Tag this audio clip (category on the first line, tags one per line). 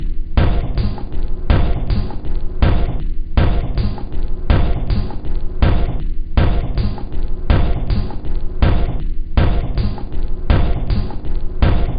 Instrument samples > Percussion
Loop
Samples
Dark
Industrial
Ambient
Loopable
Underground
Drum
Soundtrack
Packs
Alien
Weird